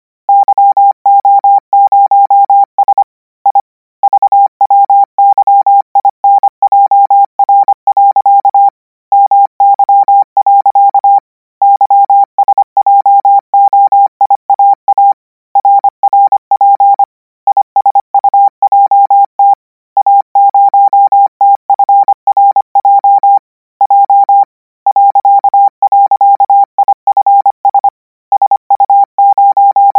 Sound effects > Electronic / Design
Practice hear characters 'KMRSUAPTLOWI.NJEF0YV' use Koch method (after can hear charaters correct 90%, add 1 new character), 600 word random length, 25 word/minute, 800 Hz, 90% volume. Code: yo0s i vwyinjr. my. ysjoiaa rrp isujt a0tfrj j ..ifs su0kowr 0et.vmvl llj jafmom. uvmkf oteafw.l nk ikw0iuev0 korfkef f fus. fvj fwynsti stkn0v jo m tputaftnf muukwtt 0w 0ew muoealj . oolr0rs pesn 0wuy .lt nfk apj0ts t tw m ku enuvius. l0kk aprj 0ant.e. s.r0tejv yrim l stwrmatir wptr.s p.jmpnkf swrssy jk.itenk ivtsjjmv saaej.vf riaaaaw uanui fw rfrmf0 muap mnw yeweayv ufpm fmpne muisofm 0jpm jivi yj jktyo 0jf e. wnfswn0fj wyurkslpo akwa0fn sppjv omtty .ki0j . omfjn krjal0sps kn t.p ft nmuafnvu .r el0 ynjnljyu wietwt ssw. ofo inkv losrssfmk usasna lkakmpo k.e.syknn f0i00mnk faf. fop le.kvl. pn ie lpn0 povsje kslynf pfu mpujremau ynkasik ptomtte .